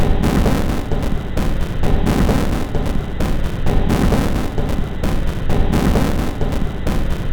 Instrument samples > Percussion
This 131bpm Drum Loop is good for composing Industrial/Electronic/Ambient songs or using as soundtrack to a sci-fi/suspense/horror indie game or short film.
Alien, Ambient, Dark, Drum, Industrial, Loop, Loopable, Packs, Samples, Soundtrack, Underground, Weird